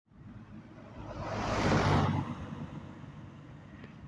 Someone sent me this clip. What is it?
Sound effects > Vehicles
car driving by
automobile, car, drive, driving, vehicle